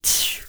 Sound effects > Other
status frozen
43 - Applying the "Frozen" Status Foleyed with a H6 Zoom Recorder, edited in ProTools